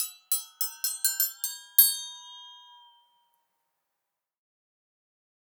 Instrument samples > Percussion
Saunatone Perc 01A (Improvised Flexatone)
Recording of a löylykauha being hit couple times with a triangle ^w^ Sounds sorta similar to GTA San Andreas intro flexatone sound, but that is only by accident XD
dnb, flexatone, goofy, jungle, liquiddnb, percussion, triangle